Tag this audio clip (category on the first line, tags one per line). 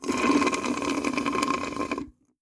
Sound effects > Human sounds and actions
drink
Phone-recording
foley
straw
suck